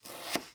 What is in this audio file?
Sound effects > Other
Hard chop vegetable 1
Chief, Chop, Cook, Cooking, Cut, House, Household, Indoors, Kitchen, Knife, Slice, Vegetable